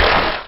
Instrument samples > Percussion
liquisplash 1 semibrief
A chorused liquichurn aliendrum.
watery, fluid, aliendrum, muddy, drain, douse, splash, splatter, hydrous, liquefied, sodden, water, liquid, alienware, wet, nature, plunge, squelchy, drip, boggy, swampy, weird-drum, damp, saturated, shit, waterlogged, soggy, aqueous, drum